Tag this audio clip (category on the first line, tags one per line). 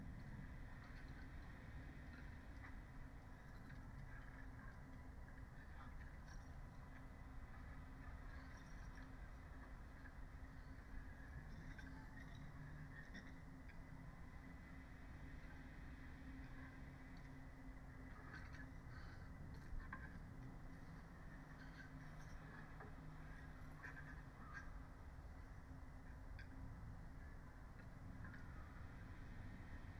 Soundscapes > Nature
alice-holt-forest
Dendrophone
field-recording
natural-soundscape
sound-installation
soundscape
phenological-recording
data-to-sound
raspberry-pi